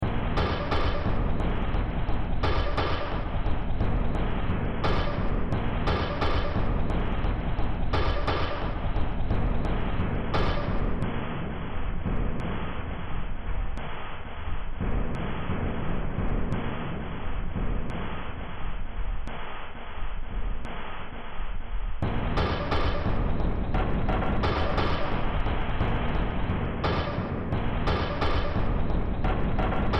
Music > Multiple instruments
Demo Track #3973 (Industraumatic)
Ambient, Cyberpunk, Games, Horror, Industrial, Noise, Sci-fi, Soundtrack, Underground